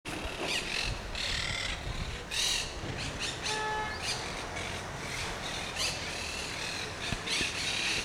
Soundscapes > Urban
20251118 Lanzarote HajraAllisonEsmeralda
Ambience, SoundMap, Urban